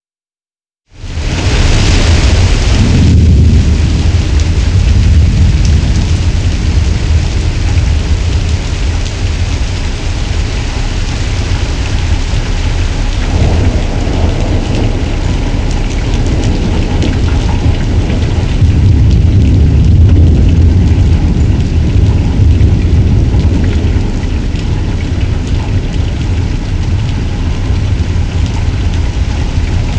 Soundscapes > Nature
Experimental Thunderstorm Recording
Ambience, Ambient, Atmosphere, City, Experimental, Field, Field-recording, Geophone, Outside, Rain, Recording, Soundscape, Storm, Thunder, urban-weather, Weather